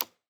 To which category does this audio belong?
Sound effects > Human sounds and actions